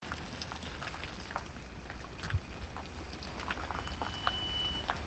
Sound effects > Vehicles
slow moving car wintertyres braking
Car with studded tyres moving at idle speed on a paved parking lot and slowing down on a crossing with its' brakes squealing. Recorded in an urban setting on a parking lot in near-zero temperature, using the default device microphone of a Samsung Galaxy S20+.